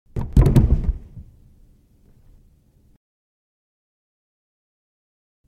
Sound effects > Human sounds and actions
Sound of a body dropping on a wooden floor with some ambience.
thump, body, fall